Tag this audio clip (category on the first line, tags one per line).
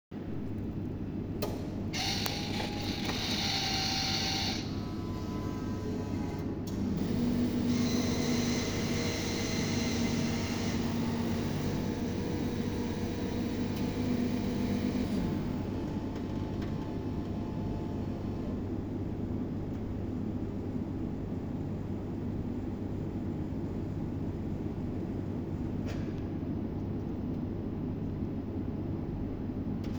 Sound effects > Other mechanisms, engines, machines
atmophere
field
recording